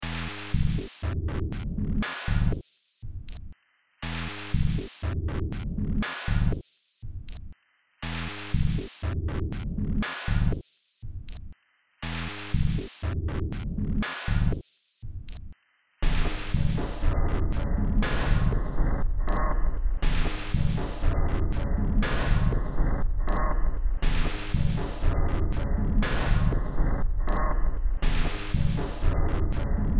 Music > Multiple instruments
Demo Track #3826 (Industraumatic)
Industrial, Horror, Ambient, Underground, Soundtrack, Sci-fi, Games, Noise, Cyberpunk